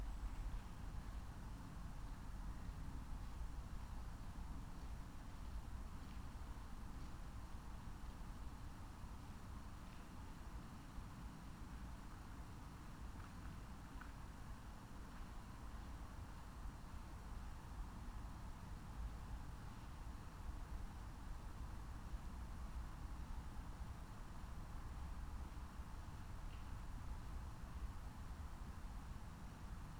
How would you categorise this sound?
Soundscapes > Nature